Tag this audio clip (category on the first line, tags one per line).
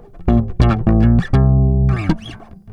Instrument samples > String
riffs
fx
funk
mellow
electric
plucked
oneshots
loops
loop
pluck
bass
slide
blues
charvel
rock